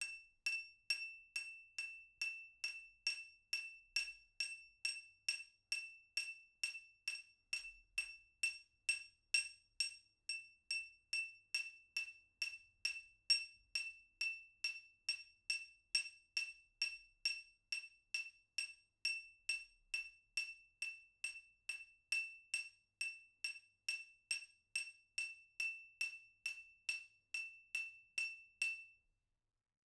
Sound effects > Other
Glass applause 32
applause, cling, clinging, FR-AV2, glass, individual, indoor, NT5, person, Rode, single, solo-crowd, stemware, Tascam, wine-glass